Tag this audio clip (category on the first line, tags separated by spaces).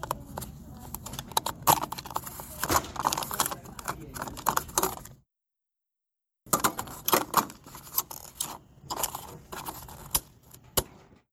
Sound effects > Vehicles
gas-cap Phone-recording twist